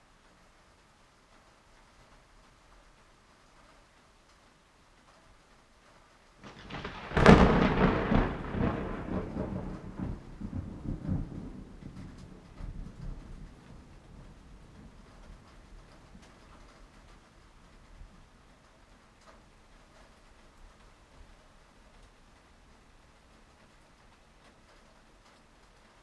Sound effects > Natural elements and explosions
Lightning strike HQ
The sound of a lightning strike recorded in the city. The Tascam Portacapture X8 recorder was used.
thunderclap, lightning, thunderstorm, nature, weather, explosion, rain, thunder, sparks, storm, electricity, strike, impact, thunder-storm, field-recording